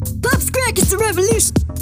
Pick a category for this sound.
Speech > Processed / Synthetic